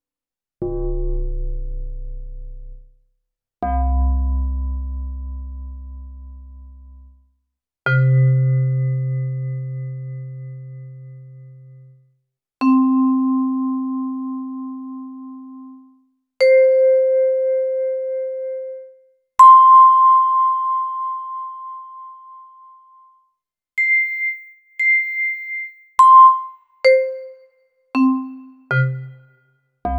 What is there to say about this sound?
Instrument samples > Piano / Keyboard instruments
Yamaha E-Piano vibraphone
Yamaha electric piano, misc vibraphone sounds.
vibraphone, keys, Yamaha, epiano